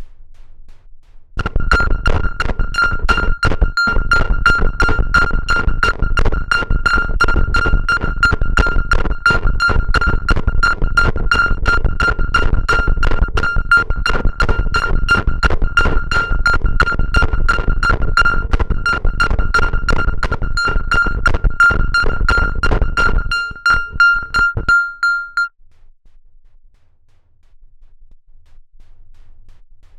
Music > Solo percussion
Simple Bass Drum and Snare Pattern with Weirdness Added 040
Interesting-Results, Four-Over-Four-Pattern, Experiments-on-Drum-Patterns, Bass-Drum, Silly, FX-Drum-Pattern, Noisy, Bass-and-Snare, FX-Drum, Glitchy, FX-Laden-Simple-Drum-Pattern, FX-Drums, Experimental, Experimental-Production, Simple-Drum-Pattern, Fun, Experiments-on-Drum-Beats, Snare-Drum, FX-Laden